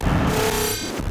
Sound effects > Electronic / Design
A glitch one-shot SX designed in Reaper with Phaseplant and various plugins.